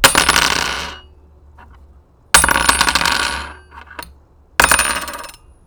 Sound effects > Objects / House appliances
METLImpt-Blue Snowball Microphone, CU Jaw Harp, Drops, X3 Nicholas Judy TDC
A jaw harp drops three times.
Blue-brand, Blue-Snowball, drop, foley, jaw-harp, metal